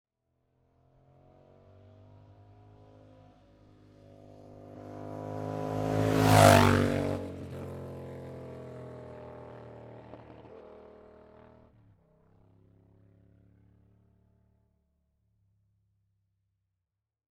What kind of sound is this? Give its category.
Sound effects > Vehicles